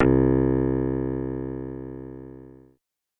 Instrument samples > Synths / Electronic
A slap bass i made in furnace, based off the SNES sample system.
C-note, Slap-bass, SNES